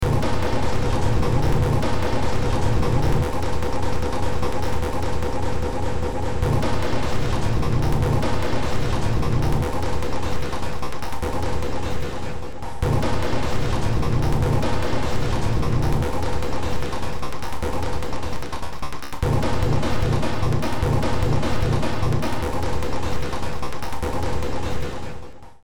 Music > Multiple instruments
Short Track #3693 (Industraumatic)
Ambient, Cyberpunk, Games, Horror, Industrial, Noise, Sci-fi, Soundtrack, Underground